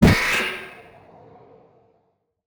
Sound effects > Electronic / Design
Fantasy Laser Cannon 2
laser, bean, zap, shot, gun